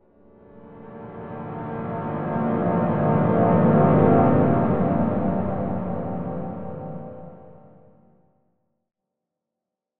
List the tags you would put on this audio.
Music > Multiple instruments
horror-swell sinister horror-riser crescendo horror-stab horror-movie-sting scary-crescendo fearsome-crescendo terrifying-crescendo horror-chord sinister-riser horror-sting dark-crescendo horror-impact scary-sting horror-movie-impact horror-movie-hit spooky-chord horror-movie-stab spooky spooky-riser horror-movie-chord horror-movie-crescendo spooky-crescendo horror-hit horror-crescendo

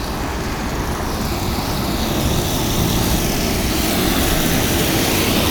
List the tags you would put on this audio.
Sound effects > Vehicles
vehicle bus transportation

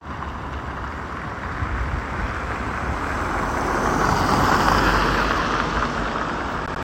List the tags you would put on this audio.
Sound effects > Vehicles
car tire road

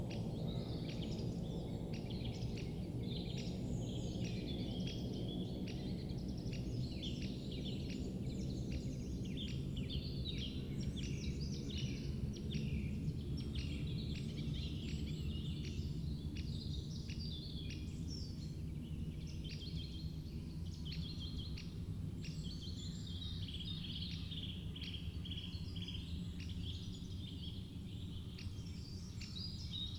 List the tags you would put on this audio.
Soundscapes > Nature
Dendrophone sound-installation field-recording natural-soundscape raspberry-pi modified-soundscape data-to-sound nature weather-data soundscape phenological-recording alice-holt-forest artistic-intervention

